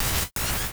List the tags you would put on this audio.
Sound effects > Electronic / Design
button
interface
menu
alert

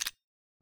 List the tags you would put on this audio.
Sound effects > Other mechanisms, engines, machines
recording
percusive
sampling
shaker

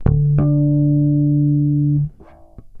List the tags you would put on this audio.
Instrument samples > String
bass
blues
charvel
electric
funk
fx
loop
loops
mellow
oneshots
pluck
plucked
riffs
rock
slide

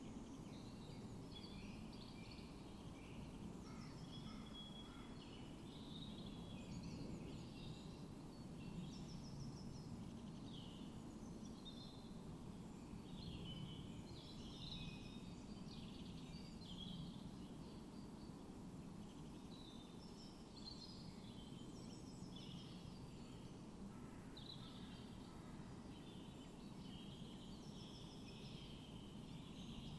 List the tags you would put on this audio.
Soundscapes > Nature
raspberry-pi
weather-data
phenological-recording
natural-soundscape
field-recording
artistic-intervention
alice-holt-forest
sound-installation
soundscape
data-to-sound
Dendrophone
modified-soundscape
nature

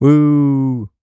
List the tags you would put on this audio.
Speech > Solo speech
2025
Adult
Calm
FR-AV2
Generic-lines
Hypercardioid
MKE-600
sarcasm
Shotgun-mic
Single-mic-mono
Tascam
whooo

